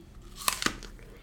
Sound effects > Human sounds and actions
apple crunch eat sound
apple fruit crunch / eat sound Recorded for my game by using Blue Yeti Stereo Microphone.
bite, eat, apple, crunch, fruit